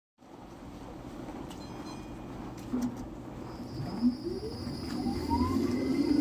Soundscapes > Urban
final tram 26
Tram Sound captured on iphone 15 Pro.
finland, hervanta